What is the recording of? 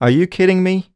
Speech > Solo speech
Annoyed - Are you kidding me
annoyed, dialogue, FR-AV2, grumpy, Human, Male, Man, Mid-20s, Neumann, NPC, oneshot, singletake, Single-take, talk, Tascam, U67, upset, Video-game, Vocal, voice, Voice-acting